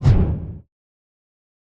Sound effects > Other
Sound Design Elements Whoosh SFX 040
trailer, effects, fast, motion, sound, swoosh, production, fx, elements, dynamic, audio, whoosh, element